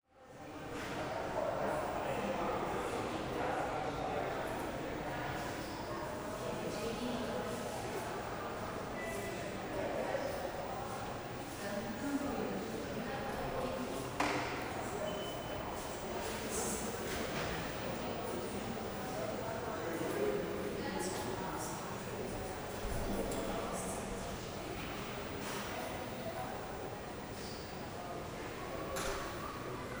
Soundscapes > Urban
AMBPubl Passage GILLE DARMSTADT Rode BFormat 13.49 4-48-24
3D, ambience, ambisonics, spatial